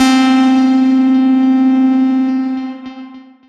Instrument samples > Synths / Electronic
CVLT BASS 136
synthbass, wavetable, sub, bass, stabs, lowend, synth, low, lfo, bassdrop, subwoofer, subs, drops, clear, subbass, wobble